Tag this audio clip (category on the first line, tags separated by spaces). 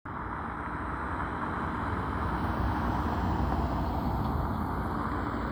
Sound effects > Vehicles
engine vehicle car